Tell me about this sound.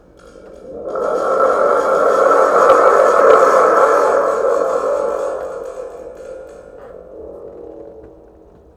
Music > Solo percussion
MUSCPerc-Blue Snowball Microphone, CU Thunder Tube, Rumble Nicholas Judy TDC
A thunder tube rumble.